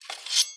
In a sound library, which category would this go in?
Sound effects > Objects / House appliances